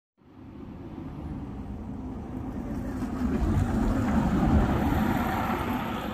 Sound effects > Vehicles
final bus 32
Bus Sound captured on iphone 15 Pro.